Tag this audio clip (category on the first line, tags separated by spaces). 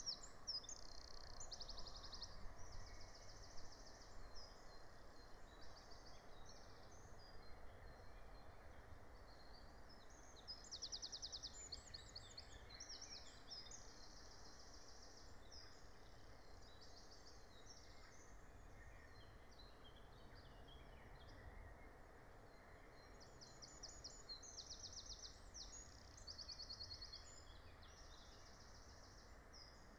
Soundscapes > Nature
field-recording raspberry-pi meadow soundscape nature